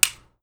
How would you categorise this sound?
Sound effects > Objects / House appliances